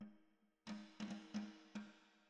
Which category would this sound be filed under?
Music > Solo percussion